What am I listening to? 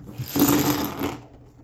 Sound effects > Objects / House appliances
GAMEBoard-Samsung Galaxy Smartphone, CU Chess, Pieces, Drop Nicholas Judy TDC
Chess pieces dropping.
chess, drop, fall, foley, Phone-recording, pieces